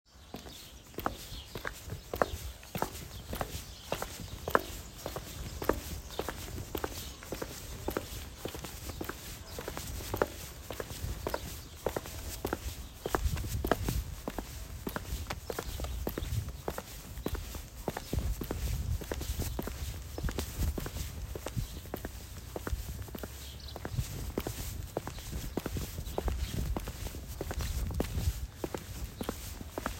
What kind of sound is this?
Sound effects > Human sounds and actions
Date and Time: 29th april, 2025 at 7:45 pm Venue: Moreira do Lima, Ponte do Lima Sound type: Sound signal – not characteristic of the venue but stood out from the rest Type of microphone used: Iphone 14 omnidirectional internal microphone (Dicafone was the application used) Distance from sound sources: I recorded with my phone in my hands while walking, so 1 meter approximately